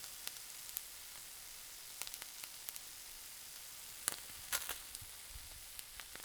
Sound effects > Objects / House appliances
Sizzling food 01
Cooking, Food, Household, Kitchen